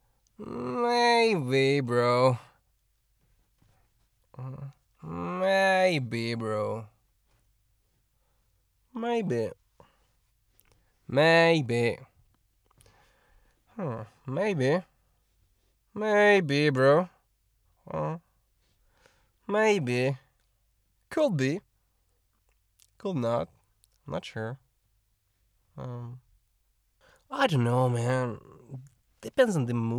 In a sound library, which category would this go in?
Speech > Solo speech